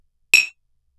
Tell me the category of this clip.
Sound effects > Objects / House appliances